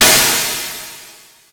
Instrument samples > Percussion
Magical Cymbal9
Cymbal, Magical, Percussion, Enthnic, FX